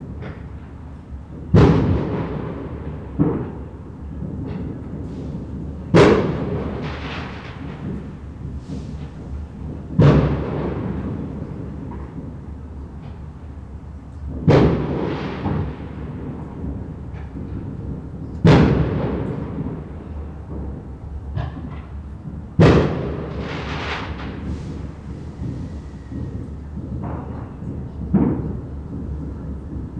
Sound effects > Other
Firework distant
Recorded in an interior garden during Halloween. Zoom h4n
field-recording, firework